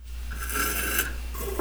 Sound effects > Objects / House appliances
aluminum can foley-006
alumminum, can, foley, fx, household, metal, scrape, sfx, tap, water